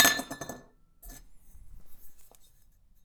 Sound effects > Other mechanisms, engines, machines
metal shop foley -227
pop, oneshot, crackle, shop